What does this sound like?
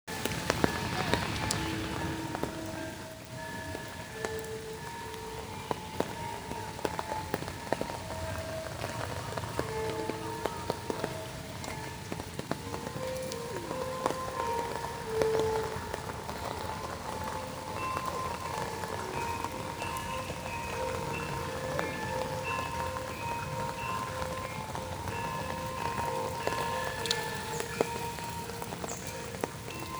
Music > Multiple instruments
Gamelan Rehearsal in the Rain
Bonang Barung of a Gamelan ensemble being played, probably. I didn't actually see the instruments. Approaching a rehearsal or music jam session in Java, holding an umbrella under light rain. Somewhere on the area of the Prambanan Temple. Indonesian percussion instruments playing a melody. Other elements captured: child voices, laughter, a bicycle(?) passing by. Recorded Jan 29, 2025 in Yogyakarta using a Moto G34, not edited.